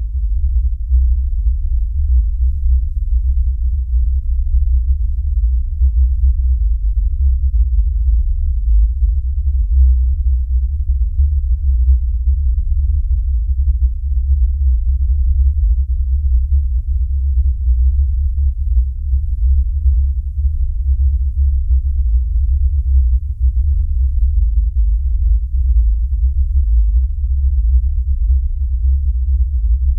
Sound effects > Experimental
"Even at 20,000 feet underground, I remember the sounds of those engines up on the surface." For this sound effect I used a Zoom H4n multitrack recorder to capture ambient sounds in my home. I then used Audacity to push those recordings to their limit with effects and other mutations. The end result is this upload.